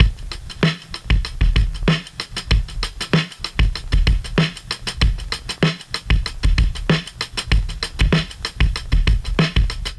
Music > Solo percussion
bb drum break loop squa 96
A short set of Acoustic Breakbeats recorded and processed on tape. All at 96BPM